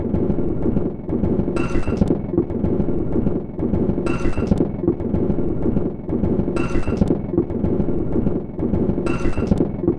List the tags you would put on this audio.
Instrument samples > Percussion
Weird Drum

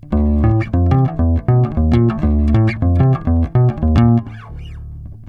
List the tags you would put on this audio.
Instrument samples > String
mellow rock electric riffs plucked blues funk oneshots bass charvel fx pluck loop slide loops

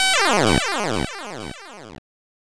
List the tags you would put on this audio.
Sound effects > Electronic / Design
synth echo retro

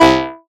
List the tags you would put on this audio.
Instrument samples > Synths / Electronic
fm-synthesis bass

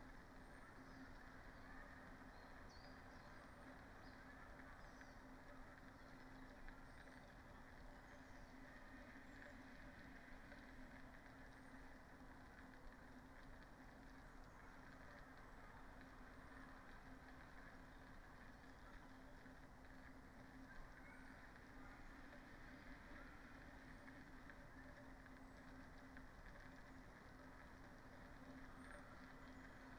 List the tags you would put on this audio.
Soundscapes > Nature
natural-soundscape soundscape field-recording sound-installation nature phenological-recording weather-data alice-holt-forest raspberry-pi artistic-intervention Dendrophone modified-soundscape data-to-sound

